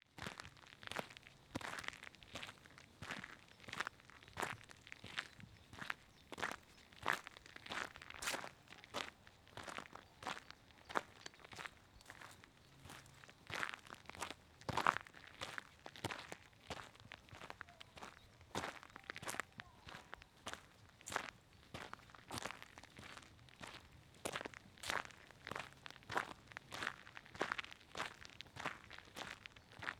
Soundscapes > Other
A recording of me walking on gravel in walking boots.
gravel, walking, outdoors, boots, footsteps